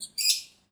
Sound effects > Animals
Upset Bird
Caged bird recorded Jan 28, 2025 at the Affandi Museum in Yogyakarta (aka Yogya, Jogjakarta, Jogja) using a Moto G34, cleaned up in RX and Audacity. Includes silly and German tags.
birb, angry, bird-chirp, short-chirp, vogel, indonesia, angry-bird, bird, zwitschern, short, indonesien, chirp, calling, isolated, call, upset, birdie, bird-chirping, vogelgezwitscher, single